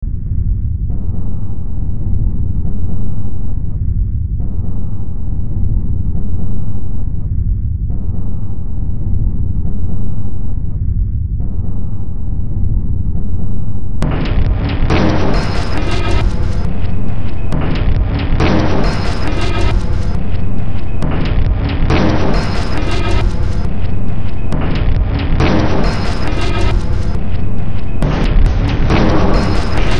Music > Multiple instruments
Demo Track #3754 (Industraumatic)
Cyberpunk, Ambient, Horror, Soundtrack, Games, Noise, Sci-fi, Industrial, Underground